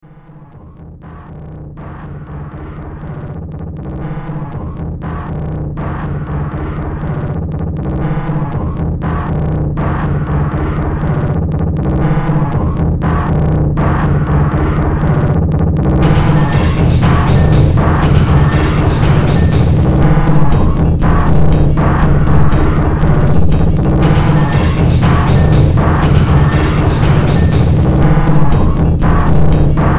Music > Multiple instruments
Demo Track #3212 (Industraumatic)
Underground, Noise, Games, Soundtrack, Sci-fi, Ambient, Industrial, Cyberpunk, Horror